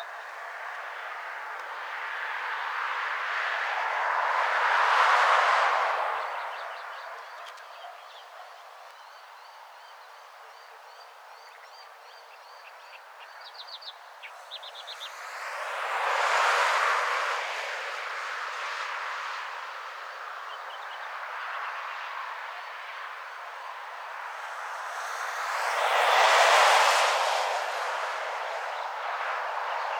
Sound effects > Other mechanisms, engines, machines
Vehicles travelling along a main road adjacent a suburban wetland. Sound of vehicles, wind and birds. Processed in iZotopeRX then rendered in Reaper
engine, road, motor, birds, wind, driving, wetland, sfx, vehicle, car